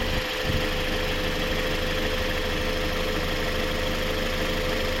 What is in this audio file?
Sound effects > Other mechanisms, engines, machines
clip auto (2)
Avensis, Auto, Toyota